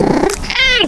Soundscapes > Other
My boy Ringo's purr-meow